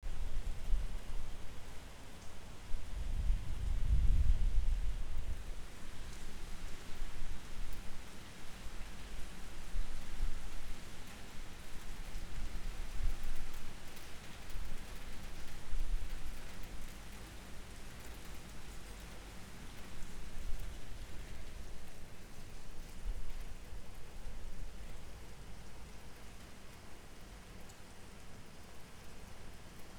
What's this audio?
Soundscapes > Urban
Typhoon Ragasa No.10 in Hong Kong
Recorded under Hong Kong Tropical cyclone warning signal No.10 at 24th Sep, 2025.